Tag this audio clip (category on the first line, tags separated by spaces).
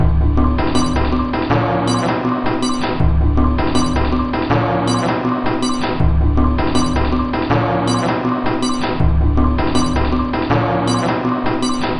Instrument samples > Percussion
Ambient Drum Alien Weird Underground Samples Loop Dark Industrial Loopable Packs Soundtrack